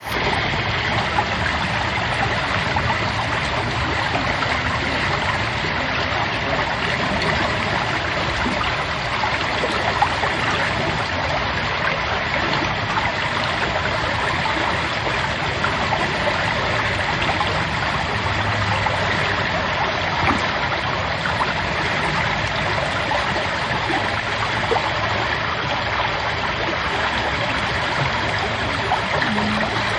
Sound effects > Natural elements and explosions
iPhone 16 stereo recording of creek